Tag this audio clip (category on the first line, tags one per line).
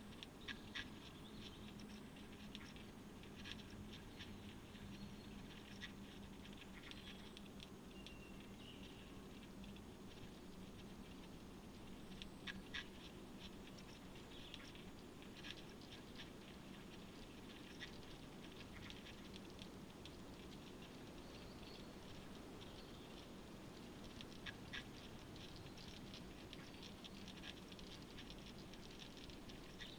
Soundscapes > Nature
data-to-sound
weather-data
field-recording
alice-holt-forest
nature
Dendrophone
phenological-recording
soundscape
artistic-intervention
raspberry-pi
sound-installation
natural-soundscape
modified-soundscape